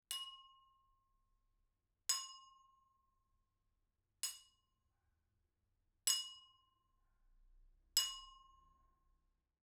Sound effects > Other
Glass applause 36
clinging, solo-crowd, stemware, person, Tascam, cling, applause, indoor, wine-glass, individual, NT5, XY, FR-AV2, glass, Rode, single